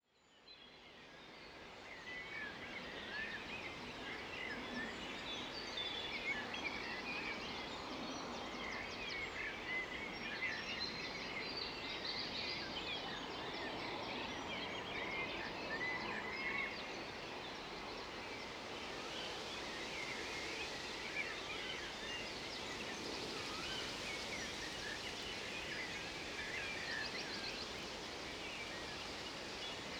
Soundscapes > Nature
Windy Dawn Chorus
Dawn chorus on a windy morning. Recorded on 14/05/2025. Sunrise was at 05:10 at this location and the time represented by the recording is approximately 04:35 to 05:55 (all times BST). The worst of the aircraft, road and rail noise has been removed, though some will still be evident, in spite of the wind! Location is in East Sussex, UK. Birds heard include: Tawny owl Chaffinch Blackcap Common whitethroat Eurasian wren Carrion crow Song thrush Blue tit Common woodpigeon Eurasian magpie Great tit Chiffchaff Blackbird Unattended recording with a Zoom H1e and Earsight stereo pair of microphones being left overnight in a hawthorn tree.